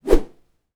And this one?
Sound effects > Natural elements and explosions
Stick - Whoosh 5
fast, FR-AV2, NT5, one-shot, oneshot, Rode, SFX, stick, Swing, swinging, tascam, Transition, whoosh, whosh, Woosh